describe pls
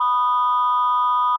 Instrument samples > Synths / Electronic
Landline Phonelike Synth B6
Holding-Tone, JI, JI-3rd, JI-Third, just-minor-3rd, just-minor-third, Landline, Landline-Holding-Tone, Landline-Phone, Landline-Phonelike-Synth, Landline-Telephone, Landline-Telephone-like-Sound, Old-School-Telephone, Synth, Tone-Plus-386c